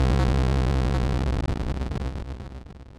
Sound effects > Electronic / Design
Destruction Sound
Could be used as an explosion sound in games or TV. Note C3. This sound was not created using A.I. Created using a Reason 12 synthesizer.